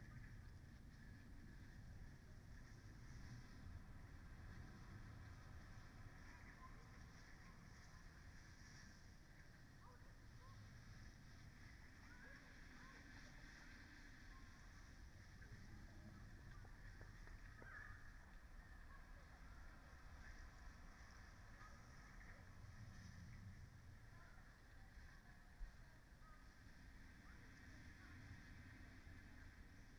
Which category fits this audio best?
Soundscapes > Nature